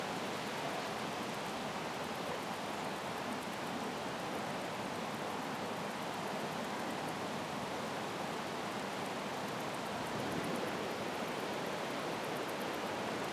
Soundscapes > Nature
Trees blowing in the wind
Recorded with TASCAM DR100mk2 +rode stereo mic.
blowing, breeze, gust, windy